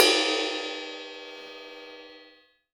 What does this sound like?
Music > Solo instrument
Cymbal Muted-002
Crash, Cymbals, FX, Metal, Paiste, Perc, Ride